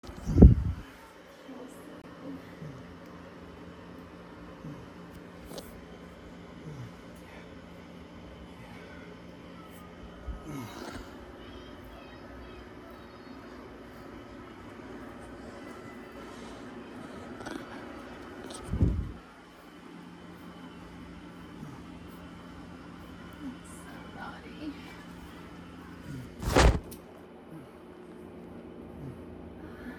Speech > Solo speech
Extended sex session
Wife moans and talks dirty for 10 minutes of great sex.
Sex, Woman